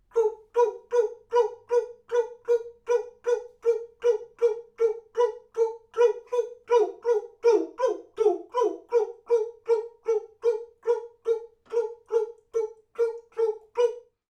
Sound effects > Human sounds and actions
Alien - Cheer 7 Clouck
Alien / Weird / Other worldly or fake-culture like applauses. I should have stuck to one kind of either bops or chicks or "ayayayay" rather than making multiple different kinds, the result would have been more convincing. Also only 13 different takes is a little cheesy. I find those applause type things need around 20-30. A series of me recording multiple takes in a medium sized bedroom to fake a crowd. Clapping/talking and more original applause types, at different positions in the room. Recorded with a Rode NT5 XY pair (next to the wall) and a Tascam FR-AV2. Kind of cringe by itself and unprocessed. But with multiple takes mixed it can fake a crowd. You will find most of the takes in the pack.
NT5, original, solo-crowd, applause, Alien, weird